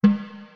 Music > Solo percussion
Snare Processed - Oneshot 85 - 14 by 6.5 inch Brass Ludwig
hits, realdrum, snaredrum, beat, fx, roll, rim, ludwig, acoustic, reverb, drums, snareroll, realdrums, flam, rimshot, kit, snares, crack, oneshot, brass, hit, rimshots, drum, percussion, snare, sfx, processed, drumkit, perc